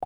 Sound effects > Other
button click bubble
It's a sound created for the game Dungeons & Bubbles for the Global Game Jam 2025.